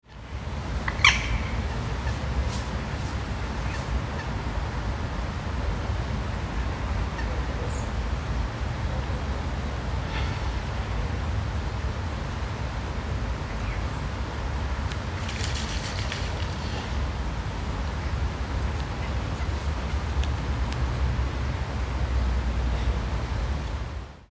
Soundscapes > Nature
Soundscape of the river bank in Golena San Massimo, Padova. A song from a bird, as well as bird flying sounds can be appreciated in the recording. Recorded by me on a Google Pixel 9 in Padova on Oct 7th, 2025.